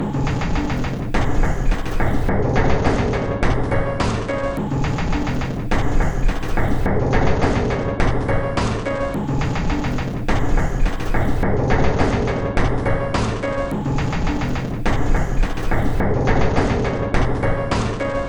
Instrument samples > Percussion
This 105bpm Drum Loop is good for composing Industrial/Electronic/Ambient songs or using as soundtrack to a sci-fi/suspense/horror indie game or short film.
Packs, Underground, Weird, Samples, Drum, Alien, Loopable, Loop, Dark, Soundtrack, Ambient, Industrial